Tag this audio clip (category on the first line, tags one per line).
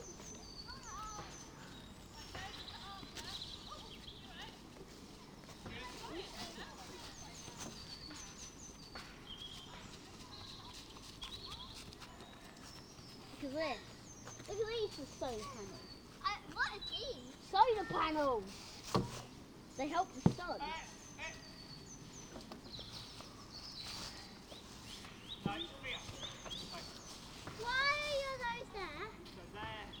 Soundscapes > Nature
data-to-sound
phenological-recording
artistic-intervention
Dendrophone
modified-soundscape
nature
field-recording
sound-installation
weather-data
soundscape
raspberry-pi
alice-holt-forest
natural-soundscape